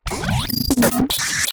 Sound effects > Experimental
Gritch Glitch snippets FX PERKZ-012
lazer, abstract, impact, clap, fx, hiphop, perc, pop, glitch, edm, experimental, glitchy, otherworldy, snap, percussion, laser, crack, zap, alien, idm, impacts, sfx, whizz